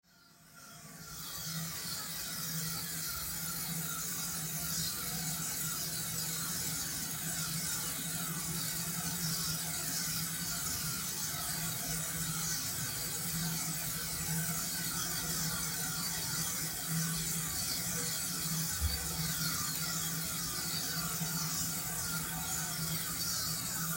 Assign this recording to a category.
Sound effects > Other mechanisms, engines, machines